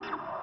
Soundscapes > Synthetic / Artificial
LFO Birdsong 44
Birdsong, LFO, massive